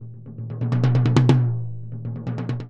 Music > Solo percussion
Med-low Tom - Oneshot 42 12 inch Sonor Force 3007 Maple Rack
Sample from a studio recording at Calpoly Humboldt in the pro soundproofed studio of a medium tom from a Sonor 3007 maple rack drum, recorded with 1 sm57 and an sm58 beta microphones into logic and processed lightly with Reaper